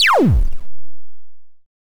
Sound effects > Electronic / Design
made in openmpt. just a fast pitch shift on a hand drawn waveform.
waveform, pitch-shift, soundeffect, lazer, electronic, synth, synthesizer, digital, effect, sci-fi